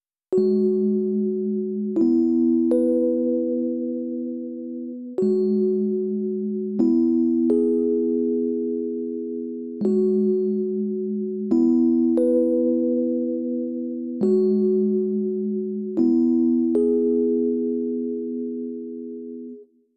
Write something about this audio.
Music > Solo instrument
invitation to meditation
Gentle chiming sounds with slight reverb invite the mind to meditation. Made with my MIDI controller.